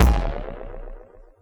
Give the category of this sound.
Instrument samples > Synths / Electronic